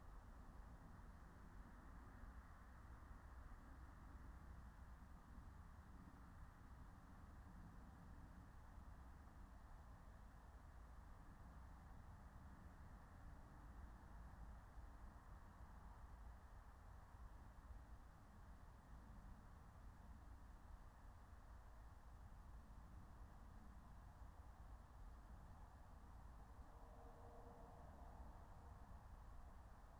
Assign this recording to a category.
Soundscapes > Nature